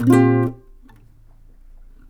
Music > Solo instrument
acoustic guitar pretty chord 8
acosutic, chord, chords, dissonant, guitar, instrument, knock, pretty, riff, slap, solo, string, strings, twang